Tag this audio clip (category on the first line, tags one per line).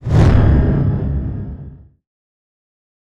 Sound effects > Other
ambient
audio
cinematic
design
dynamic
effect
effects
element
elements
fast
film
fx
motion
movement
production
sound
sweeping
swoosh
trailer
transition
whoosh